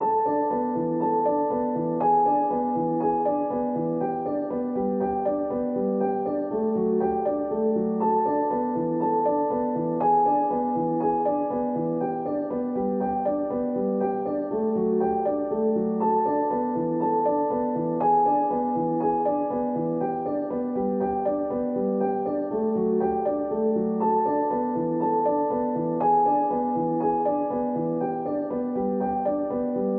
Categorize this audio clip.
Music > Solo instrument